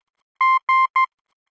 Sound effects > Electronic / Design
A series of beeps that denote the letter G in Morse code. Created using computerized beeps, a short and long one, in Adobe Audition for the purposes of free use.